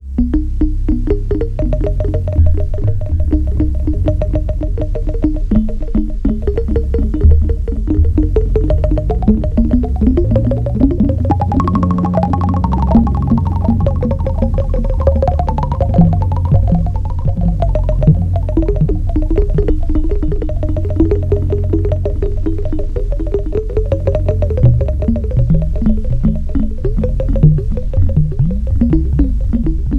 Soundscapes > Synthetic / Artificial
Tape loop done with blip blops 001
A tape loop creation done with a sound of a series of blip blops.
blip, blop, gauss, ipad, loop, tape, tape-loop